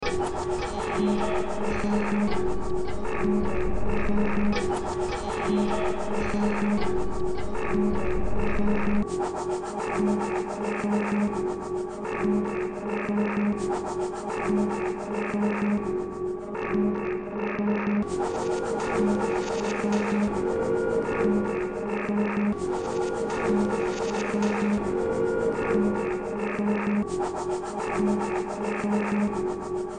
Music > Multiple instruments
Demo Track #3006 (Industraumatic)
Noise, Sci-fi, Cyberpunk, Underground, Ambient, Games, Industrial, Horror, Soundtrack